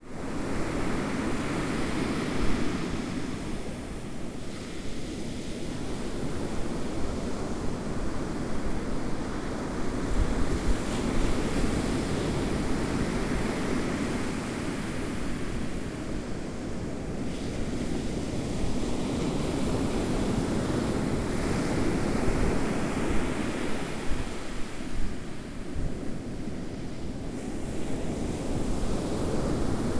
Soundscapes > Nature
Ocean Waves Crashing on the Coast of Big Lagoon, Redwoods
Roaring ocean waves splashing on a gloomy grey afternoon on the Redwoods Coast of Northern California